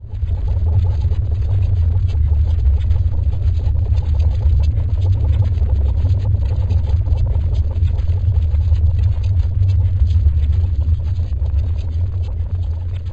Sound effects > Electronic / Design
Glitch Deep Atmosphere
an experimental atmos & ambience designed with Pigments via studio One